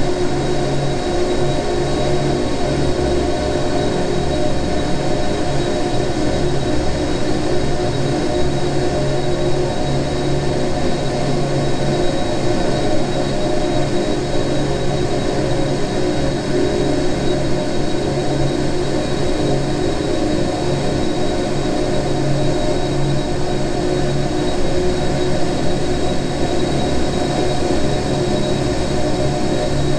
Soundscapes > Synthetic / Artificial
Angels sang of love through the enchanted machinery.
singing, soundscape